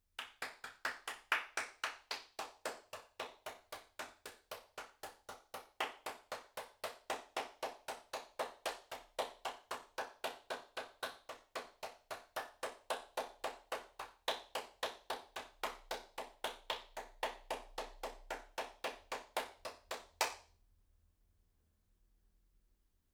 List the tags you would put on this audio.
Sound effects > Human sounds and actions
person
FR-AV2
Tascam
individual
AV2
solo
indoor
Rode
Applaud
Applause
clap
clapping
XY
NT5

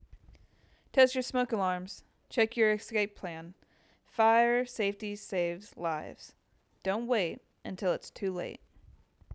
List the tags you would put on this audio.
Speech > Solo speech
FireSafety
HomeSafety
ProtectYourFamily
PSA
SafetyFirst
StaySafe

